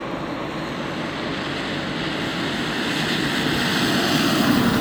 Soundscapes > Urban
Car passing by 15
tyres, driving, car